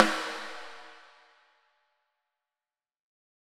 Music > Solo percussion
Snare Processed - Oneshot 44 - 14 by 6.5 inch Brass Ludwig
flam, rim, rimshot, ludwig, processed, oneshot, drums, realdrums, sfx, realdrum, kit, fx, drum, snares, rimshots, brass, roll, reverb, beat, snare, drumkit, crack, hits, perc, hit, snareroll, snaredrum, percussion, acoustic